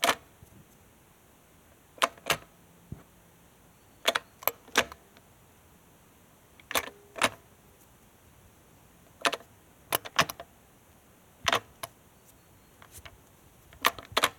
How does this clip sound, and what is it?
Sound effects > Vehicles
Pushing the gear selection fork to various positions on a gearbox (outside of the vehicle). Recorded with my phone.